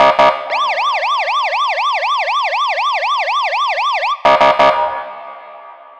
Sound effects > Electronic / Design
FX Police Siren and Stub (JH)
ambulance, emergency, stub, 911, police, siren, fire, sirens, cop, alarm